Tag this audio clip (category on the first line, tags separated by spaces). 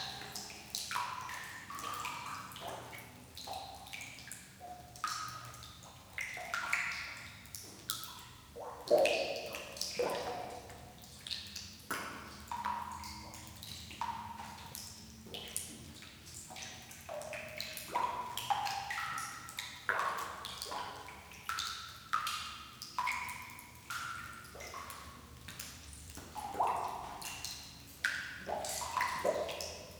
Soundscapes > Indoors
cave cavern drip dripping drops storeroom vault water